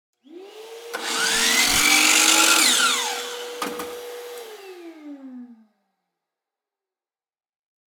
Sound effects > Other mechanisms, engines, machines
Dewalt 12 inch Chop Saw foley-052
Blade, Percussion, Tool, FX, SFX, Foley, Tooth, Chopsaw, Workshop, Circularsaw, Tools, Scrape, Teeth, Woodshop, Metallic, Perc, Metal, Saw, Shop